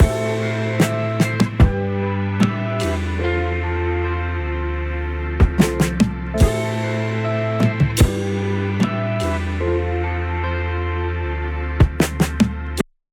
Music > Multiple instruments
Cool daft punk bridge. Part of a whole beat AI generated: (Suno v4) with the following prompt: generate a sad and chill instrumental inspired in daft punk or something similar, that will give room for emotional lyrics, in C major, at 75 bpm.